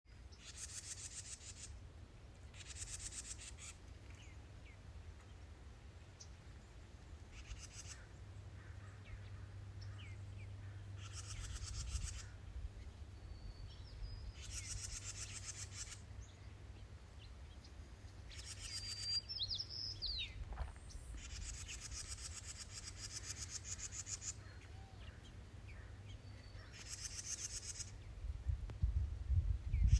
Soundscapes > Nature
Mill St bird trashing 11/04/2024
Bird in the neighborhood
birds, trashing, bird, nature, field-recording